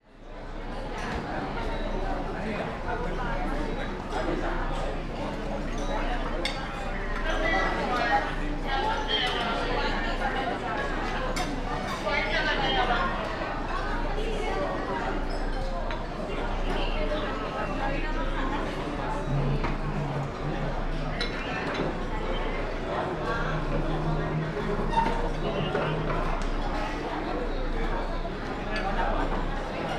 Soundscapes > Indoors
250828 081752 PH Breakfast time in hotel
Breakfast time in a hotel / restaurant. I made this recording in the breakfast / restaurant room of the Kingsford Hotel, in Manila, Philippines. One can hear the customers eating breakfast while chatting, some music in the background, and the guard’s radio-transmitter from time to time. Recorded in August 2025 with a Zoom H5studio (built-in XY microphones). Fade in/out applied in Audacity.
ambience, atmosphere, breakfast, chatting, conversations, dishes, eating, field-recording, hotel, hubbub, indoor, international, Manila, meal, men, morning, music, people, Philippines, restaurant, soundscape, talking, voices, walla, women